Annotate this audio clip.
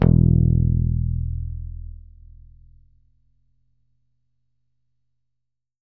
Instrument samples > String
E1 string picked and palm muted on a Squire Strat converted Bass. Static reduced with Audacity.